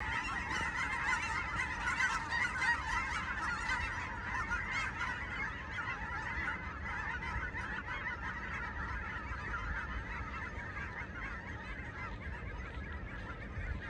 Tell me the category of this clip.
Soundscapes > Nature